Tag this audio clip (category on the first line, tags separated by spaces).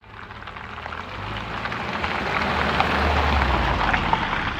Sound effects > Vehicles
car combustionengine driving